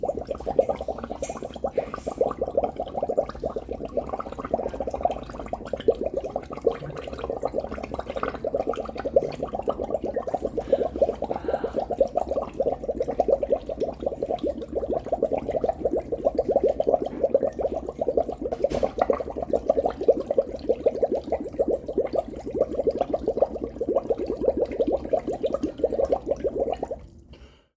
Sound effects > Natural elements and explosions
Large cauldron water with small bubbles.